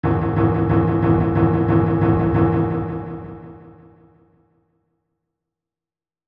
Music > Other
Unpiano Sounds 004
Distorted, Piano, Distorted-Piano